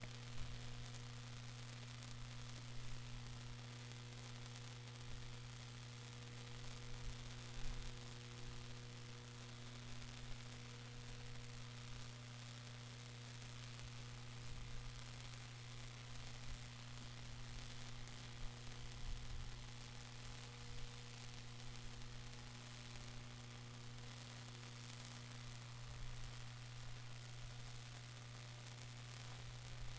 Soundscapes > Other
Acoustic Emissions from Corona Discharge in a Power Substation (500 kV - 60 Hz) - Recording date: May 13, 2025 - 15:00. - Recorder: Zoom, model H1N - Barreiras, State of Bahia, Brazil Citation: ARAUJO, R. L., Corona Discharge in a 500 kV Power Substation. Barreiras, Brazil.
60Hz, Corona, Discharge, Electric, Field, High, Power, Substation, Voltage